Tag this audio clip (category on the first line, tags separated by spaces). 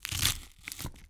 Sound effects > Experimental
bones foley onion punch thud vegetable